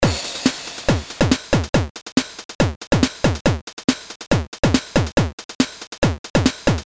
Music > Solo percussion
Gameboy Drum Loop 140 BPM
A drum loop with a late 90's handheld sound.
Drum, Sample